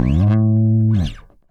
Music > Solo instrument
slide up to note higher vibrato
bass, bassline, basslines, blues, chords, chuny, electric, electricbass, funk, fuzz, harmonic, harmonics, low, lowend, note, notes, pick, pluck, riff, riffs, rock, slap, slide, slides